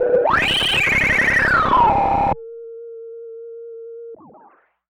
Sound effects > Experimental
Analog Bass, Sweeps, and FX-118
analogue, scifi, vintage, analog, robotic, synth, electronic, machine, complex, pad, retro, basses, electro, fx, snythesizer, sfx, robot, effect, weird, korg, sample, trippy, mechanical, dark, alien, oneshot, sweep, bass, bassy, sci-fi